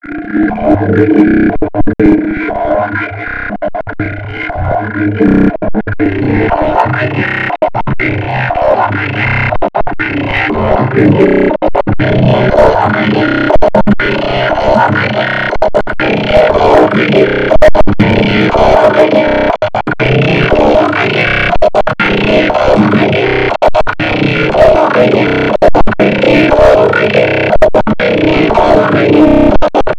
Sound effects > Experimental
Creepy growl noise made on FL Studio